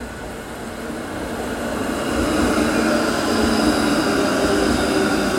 Sound effects > Vehicles
tram rain 13
A recording of a tram passing by on Insinöörinkatu 30 in the Hervanta area of Tampere. It was collected on November 7th in the afternoon using iPhone 11. There was light rain and the ground was slightly wet. The sound includes the whine of the electric motors and the rolling of wheels on the wet tracks.
motor, rain, tram